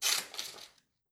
Sound effects > Objects / House appliances
OBJOffc-Samsung Galaxy Smartphone Scissors, Cut on Paper, Single Nicholas Judy TDC
Scissors cutting on paper. Single.